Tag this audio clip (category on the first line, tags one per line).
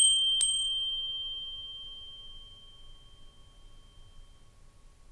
Instrument samples > Other
meditation
bowls
tibetan
tibet
buddhist